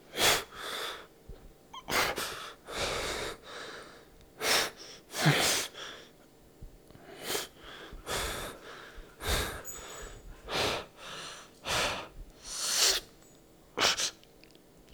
Sound effects > Human sounds and actions
Male Heavy Breaths
Breath, Breathe, Breathing, Exhale, Exhaustion, Grunt, Male